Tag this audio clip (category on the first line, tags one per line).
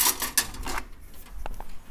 Sound effects > Other mechanisms, engines, machines
bam
boom
bop
foley
knock
little
metal
oneshot
perc
percussion
rustle
sfx
shop
sound
tools
wood